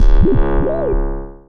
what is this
Instrument samples > Synths / Electronic
CVLT BASS 28
lfo, clear, drops, wobble, subwoofer, low, bassdrop, synthbass, sub, stabs, synth, lowend, bass, subs, subbass